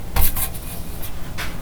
Sound effects > Other mechanisms, engines, machines
Handsaw Oneshot Metal Foley 14
Handsaw fx, tones, oneshots and vibrations created in my workshop using a 1900's vintage hand saw, recorded with a tascam field recorder
foley, fx, handsaw, hit, household, metal, metallic, perc, percussion, plank, saw, sfx, shop, smack, tool, twang, twangy, vibe, vibration